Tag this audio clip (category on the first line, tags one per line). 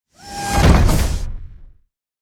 Sound effects > Other mechanisms, engines, machines
big
clang
clank
deep
footstep
futuristic
giant
heavy
impact
mechanical
metal
metallic
movement
resonant
robot
robotic
sci-fi
stomp
thud
walking